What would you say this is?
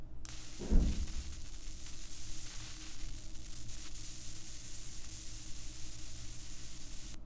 Sound effects > Objects / House appliances
A friend let me record his electric lighter with a Roland Mic. It was a RONXS lighter.
digital, electric, electric-lighter, electronic, noise, radio, static